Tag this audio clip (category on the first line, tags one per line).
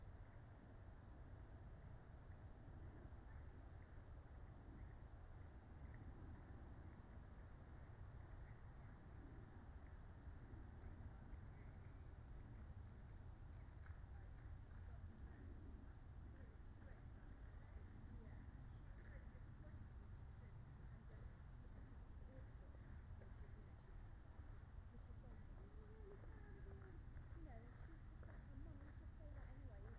Soundscapes > Nature
soundscape
alice-holt-forest
raspberry-pi
meadow
nature
natural-soundscape
field-recording
phenological-recording